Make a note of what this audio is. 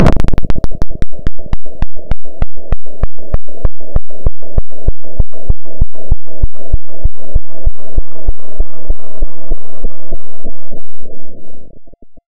Sound effects > Other
Cracking waveform
Intereresting. Made in FL Studio by plugin Fruity Blood Overdrive (Everything set to the maximum + 100x)